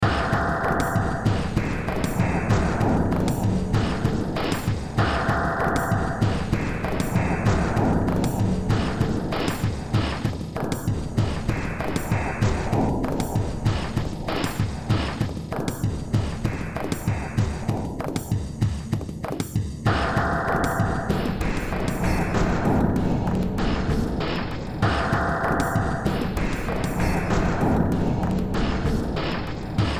Music > Multiple instruments
Demo Track #3321 (Industraumatic)
Ambient, Cyberpunk, Games, Horror, Industrial, Noise, Sci-fi, Soundtrack, Underground